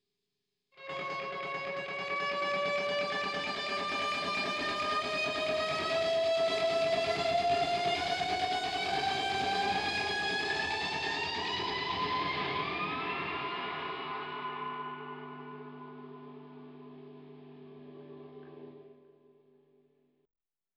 Music > Other
guitar riser 1
guitar, riser